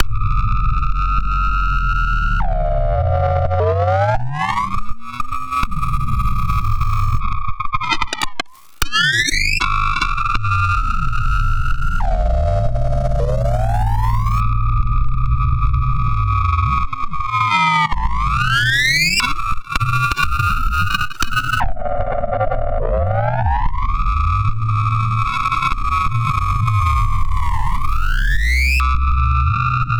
Sound effects > Electronic / Design
Optical Theremin 6 Osc Shaper Infiltrated-038
Alien, Bass, DIY, Electro, Electronic, Noise, noisey, Optical, Robotic, Sci-fi, Scifi, SFX, Spacey, Theremin